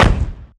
Instrument samples > Synths / Electronic
JC Kick 05
Just a dariacore kick.
kick, percussion, kick-drum, drum-kit, bass-drum, drum, one-shot, sample